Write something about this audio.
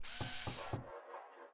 Sound effects > Objects / House appliances
Sounds of a drill, hammer, and saw played together.
drill, hammer, installation, saw, tools